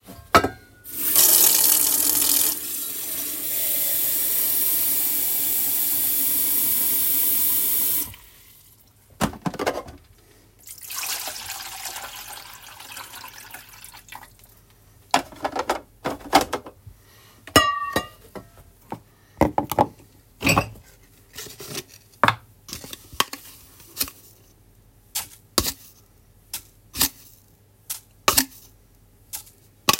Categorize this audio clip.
Sound effects > Objects / House appliances